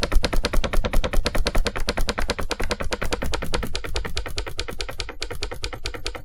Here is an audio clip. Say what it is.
Sound effects > Other
Paper flapping in a stable rhythm
Recorded on Yeti Nano. Literally, paper flapping, in a stable rhythm, I used this to fake a pinwheel-like sound effect.